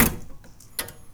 Sound effects > Other mechanisms, engines, machines
Handsaw Oneshot Hit Stab Metal Foley 15
vibe, saw, foley, percussion, plank, shop, sfx, fx, hit, smack, metal, twang, vibration, twangy, metallic, perc, tool, household, handsaw